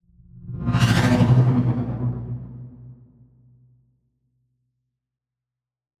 Sound effects > Electronic / Design
A synthetic whoosh designed in Vital VST.
pass-by, futiristic